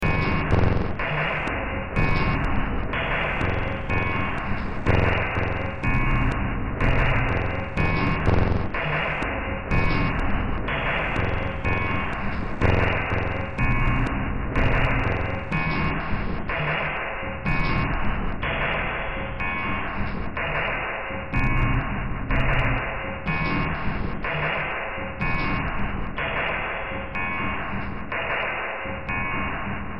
Music > Multiple instruments
Demo Track #3601 (Industraumatic)
Sci-fi, Cyberpunk, Games, Industrial, Noise